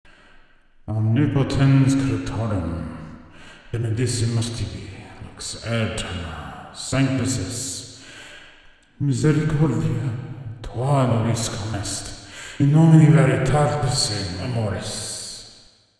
Speech > Solo speech
Creepy Latin Speech 4 Prayer or Summoning Deep Distant Voice
Deep distant voice saying random Latin words, praying, summoning, spell, chanting. Made for a story.
creepy, drama, eerie, ghost, Gothic, haunted, latin, prayer, praying, preist, scary, sinister, spookie, spooky, summoning, thrill